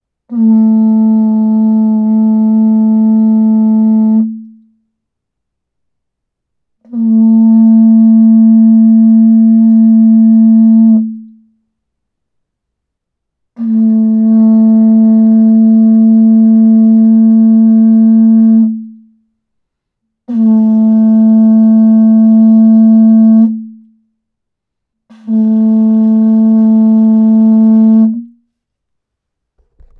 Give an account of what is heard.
Instrument samples > Wind
Big Horn
A big cattle-horn (approx. 70 cm), blown to sound in a closed room. Clean sound. Recorded on Zoom H2.
big, blow, call, deep, horn, resonant